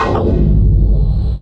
Instrument samples > Synths / Electronic
CVLT BASS 51
subbass, clear, drops, lfo, subwoofer, synthbass, low, lowend, synth, subs, bassdrop, sub